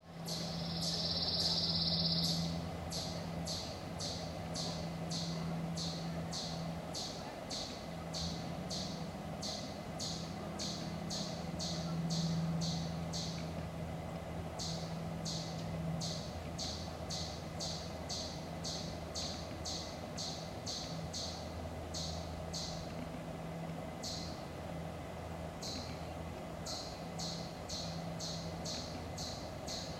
Soundscapes > Nature
East Brookfield Lake spring sunset atmos
Recorded in spring, at sunset in stereo at 16-bit at the East Brookfield Lake, MA, USA, using an Olympus LS-11 linear PCM recorder.
nature, birds, sunset, atmos, field-recording, lake, ambience